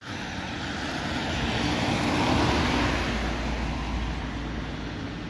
Soundscapes > Urban
The car driving by was recorded In Tampere, Hervanta. The sound file contains a sound of car driving by. I used an Iphone 14 to record this sound. It can be used for sound processing applications and projects for example.